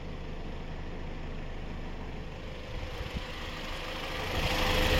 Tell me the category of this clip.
Sound effects > Other mechanisms, engines, machines